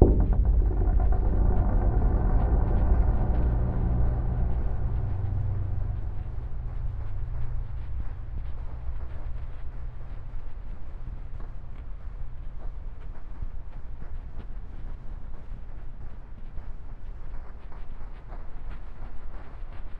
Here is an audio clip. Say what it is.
Sound effects > Objects / House appliances
Metal Fence Gong Hit Urban Percussion Big Reverb #003 via Low Frequency Geo Microphone
This sound is produced by hitting the metal fence with my hand and passing the sound into a big reverb. This sound is recorded with a Low Frequency Geo Microphone. This microphone is meant to record low-frequency vibrations. It is suitable for field recording, sound design experiments, music production, Foley applications and more. Frequency range: 28 Hz - >1000 Hz.
fence, field-recording, gong, hit, impact, metal, metallic, percussion, Reverb